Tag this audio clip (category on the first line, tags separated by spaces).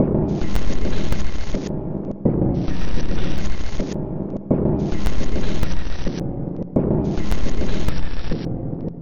Instrument samples > Percussion
Loopable Soundtrack Underground Ambient Weird Industrial Loop Dark Alien Samples Packs Drum